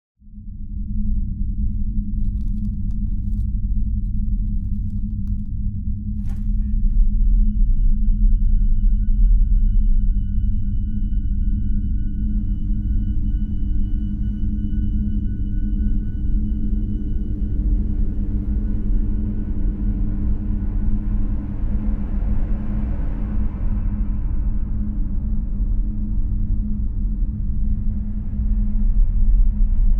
Soundscapes > Indoors
Abandoned Basement Ambience with Music
This is a chilling basement ambience combined with an eerie soundscape, featuring distant moans, metallic clangs, and unsettling atmospheric textures. I’ve added a subtle background music to enhance the creepy vibe and give it a deeper, more immersive atmosphere. The music adds a layer of psychological tension, creating a perfect horror environment. If you need string sections (violin, cello, etc.)